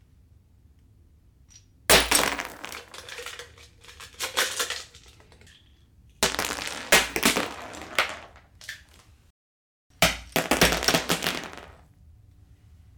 Sound effects > Objects / House appliances
ice cubes on floor
A handful of ice cubes being dropped onto a vinyl kitchen floor in various ways
floor, drop, ice, cube